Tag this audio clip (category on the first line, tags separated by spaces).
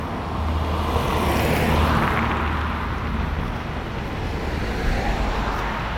Sound effects > Vehicles
Field-recording Car